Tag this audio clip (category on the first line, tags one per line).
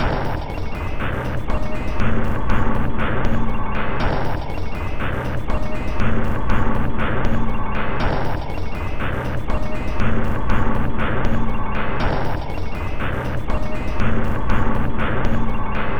Instrument samples > Percussion
Drum
Alien
Dark
Soundtrack
Samples
Packs
Weird
Ambient
Industrial
Loopable
Loop
Underground